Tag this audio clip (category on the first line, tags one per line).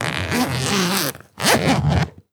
Sound effects > Objects / House appliances
bag
Clothing
luggage
Zip
Zipper